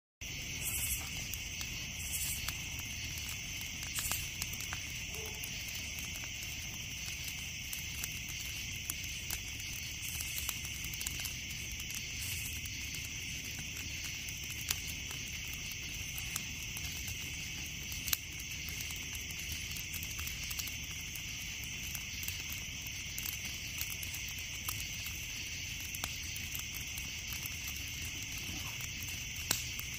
Soundscapes > Nature
This soundscape captures 4 minutes of the ambiance of a campfire at night with crackling of the wood burning and nature sounds in the background.